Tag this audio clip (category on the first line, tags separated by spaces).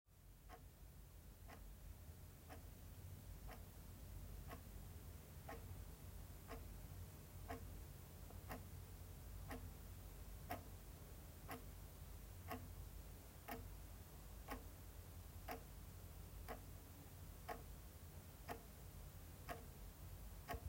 Sound effects > Objects / House appliances
ambience clock clockwork ticking tick-tock tic-tac time timepiece wall-clock